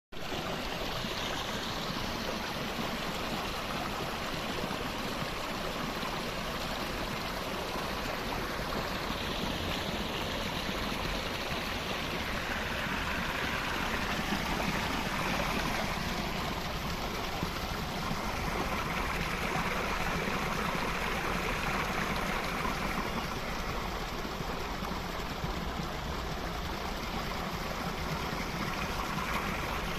Sound effects > Natural elements and explosions
Small Waterfall in a park
Recorded in a park in Lviv, this gentle waterfall sound captures a peaceful, natural flow. Shared for ambient creators and nature lovers. Enjoy!
nature, waterfall, field, calming, forest, sounds, stream, ambient, recording